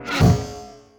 Sound effects > Electronic / Design
Fantasy Laser Cannon
laser, zapm, shoot, zap, gun